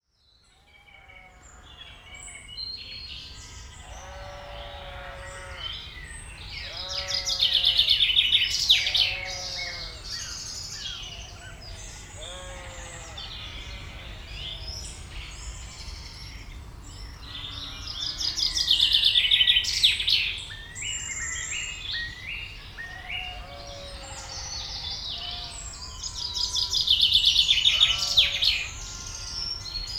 Soundscapes > Nature
A recording in a a garden, in the evening of a local B&B.